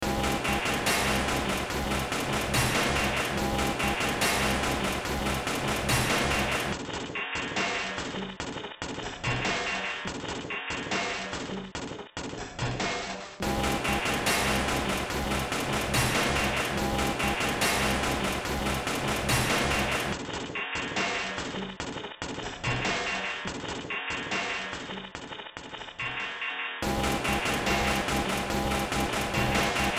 Music > Multiple instruments
Short Track #3804 (Industraumatic)
Ambient, Cyberpunk, Games, Horror, Industrial, Noise, Sci-fi, Soundtrack, Underground